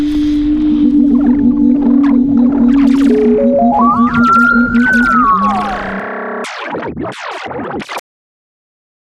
Sound effects > Experimental
Alien Animalia -001
alien creature fx created with a variety of vst effects in Reaper
delay, glitchy, SFX, otherworoldly, creature, trippy, Animal, vocal, forest, effect, scifi, bird, strange, FX, sci-fi, harmonic, sweep